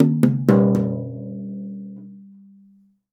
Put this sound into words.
Music > Solo instrument
Toms Misc Perc Hits and Rhythms-018
Sabian, Drum, Perc, Paiste